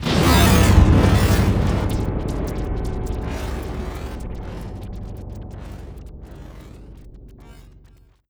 Sound effects > Electronic / Design
Magical Mirror Blast
This magical blast of mirrors ought to confound this rabble of bandits—illusions and reflections shall turn their blades upon shadows. With skill and swiftness, we'll make quick work of them before the light fades. Created by layering multiple piano notes and drums at the same time in FL Studio and passing the output through Quadrant VST. This was made in a batch of 14, many of which were cleaned up (click removal, fading, levelling, normalization) where necessary in RX and Audacity: